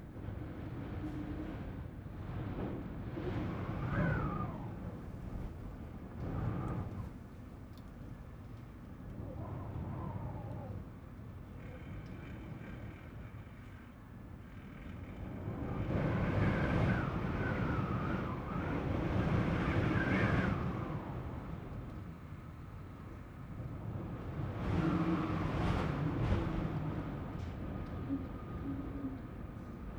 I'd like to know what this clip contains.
Soundscapes > Indoors
AMBIENCE INTERIOR WIND NOISE WINDOW
Wind coming through a small gap in the window at night Recorder: Zoom F8 Microphones: Sennheiser 8050-8030 MS setup in a Cinela Zephyx suspension.
ambience, ambient, blow, field-recording, gasps, interior, storm, wind, window